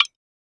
Sound effects > Objects / House appliances
Masonjar Shake 3 Perc
drop, mason-jar, splash, water